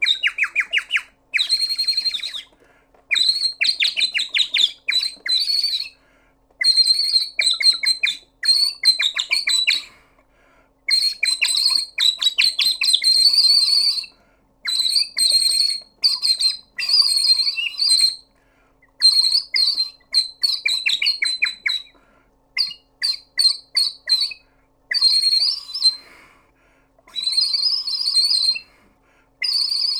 Sound effects > Animals
TOONAnml-Blue Snowball Microphone, CU Bird Whistle, Cartoon Nicholas Judy TDC
A cartoon bird whistle.
bird, Blue-brand, Blue-Snowball, cartoon, whistle